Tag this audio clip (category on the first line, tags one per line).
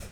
Sound effects > Objects / House appliances
household
liquid
water